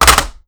Sound effects > Objects / House appliances
FOLYProp-Blue Snowball Microphone, CU VHS Tape, Drop Nicholas Judy TDC
A VHS tape drop.